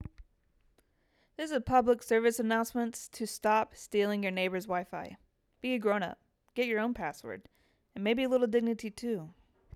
Speech > Solo speech
Funny PSA – Stop Stealing WiFi
A funny PSA reminding people (jokingly) to stop mooching off other people's internet. Perfect for light-hearted or comedy content. Script: "This is a public service announcement: stop stealing your neighbor’s WiFi. Be a grown-up. Get your own password — and maybe a little dignity too."
ComedyVoice, FunnyPSA, GetYourOwnInternet, PublicService, StayInYourLane, VoiceOver, WiFiThief